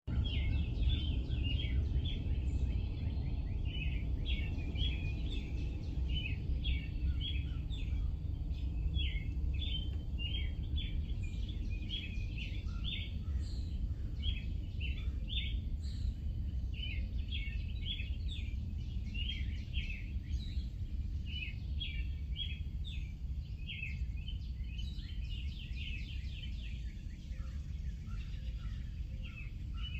Soundscapes > Nature
Birds in the Morning-Nature Sounds
This soundscape captures a 5 minute field recording of Midwestern American birds in the Springtime morning.
Peaceful, Birds, Field-recording, Birdsong, MidwesternBirds, MorningBirds, Nature, Morning